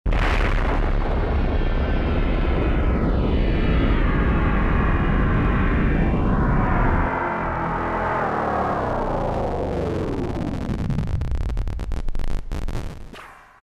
Sound effects > Natural elements and explosions
Bomb a FX
At first it sounds like an explosion but then it turns into a fantasy sound. Al comienzo parece una explosión pero luego se transforma en un sonido de fantasía.
blast, bomb, bomba, explosion, fantasy